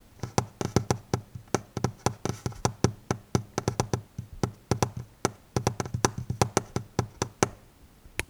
Soundscapes > Indoors
Fingers Hitting the Wood Table Top #003
The sound of fingers hitting the wood table top Recorded with Tascam Portacapture X6
fingers, hard, hitting, surface, table, wood